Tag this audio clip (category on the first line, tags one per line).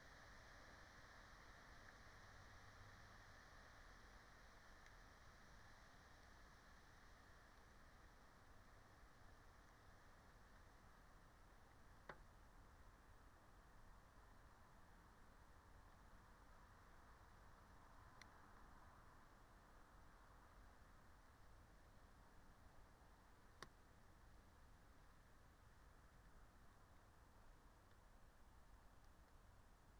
Soundscapes > Nature
soundscape
natural-soundscape